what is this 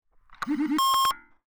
Sound effects > Other mechanisms, engines, machines
Stoplight button in Madrid
Clicking the stoplight button using a contact microphone through a TASCAM DR-05X, it goes beep beep.
analog, analogic, beep, button, click, contact, press, stoplight